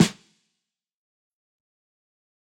Instrument samples > Percussion
Snare - soft
crunchy, lofi, distorted, perc, percussion, lo-fi, drum, electronic, hit